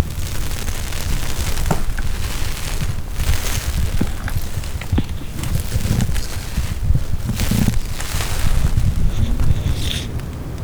Sound effects > Natural elements and explosions
Spider web - stick - 1
Subject : Removing a spiderweb with a stick. Handheld Rode NT5 microphone with a WS8 Wind-cover. Date YMD : 2025 04 22 Location : Indoor inside a barn Gergueil France. Hardware : Tascam FR-AV2, Rode NT5 WS8 windcover. Weather : Processing : Trimmed and Normalized in Audacity. Maybe some Fade in/out.
Rode, FR-AV2, halloween, Tascam, spooky, NT5